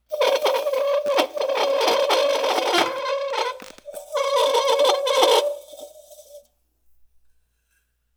Sound effects > Objects / House appliances
aluminum can foley-026
alumminum, can, foley, fx, household, metal, scrape, sfx, tap, water